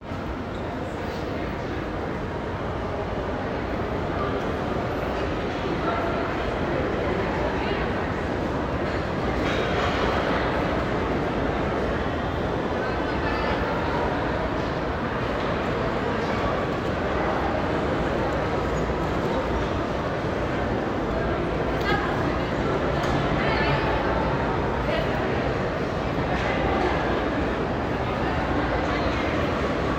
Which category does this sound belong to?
Soundscapes > Indoors